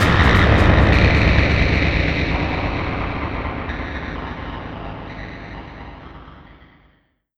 Sound effects > Electronic / Design
Jumpscare. Makes the player/viewer jump of being scare. Created by layering multiple piano notes and drums at the same time in FL Studio and passing the output through Quadrant VST. This was made in a batch of 14, many of which were cleaned up (click removal, fading, levelling, normalization) where necessary in RX and Audacity:
cinematic, creepy, danger, dread, eerie, fear, fps, fright, game, gaming, haunt, horror, horror-game, intense, jumpscare, monster, nightmare, panic, scare, scary, scream, shock, shocking, spook, stinger, sudden, suspense, tension, terror, threat